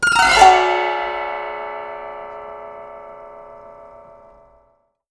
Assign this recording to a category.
Music > Solo instrument